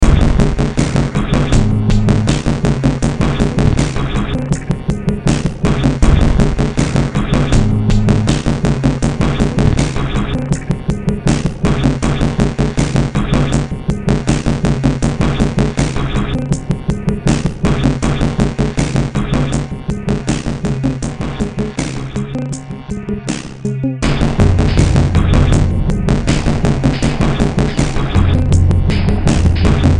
Music > Multiple instruments
Demo Track #3069 (Industraumatic)
Ambient, Cyberpunk, Games, Horror, Industrial, Noise, Sci-fi, Soundtrack, Underground